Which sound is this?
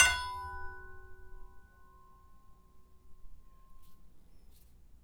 Sound effects > Other mechanisms, engines, machines
metal shop foley -028
shop, sfx, sound, tink, fx, bop, perc, tools, rustle, bang, foley, boom, little, knock, percussion, pop, bam, thud, wood, strike, oneshot, crackle, metal